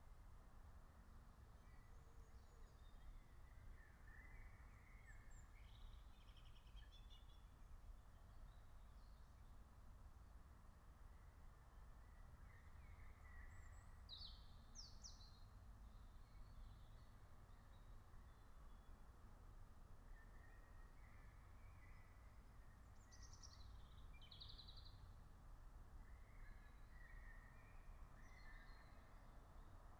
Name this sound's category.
Soundscapes > Nature